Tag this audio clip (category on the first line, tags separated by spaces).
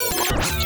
Sound effects > Electronic / Design
digital glitch hard one-shot pitched stutter